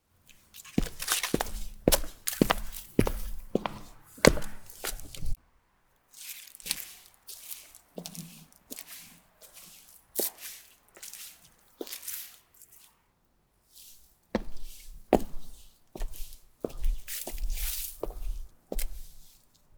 Sound effects > Human sounds and actions
slow walking on heels in the forest (stereo)
Walking at moderate speed in the forest Location: Poland Time: November 2025 Recorder: Zoom H6 - XYH-6 Mic Capsule
walk, heels, leaves, rustle, forest, stereo, field-recording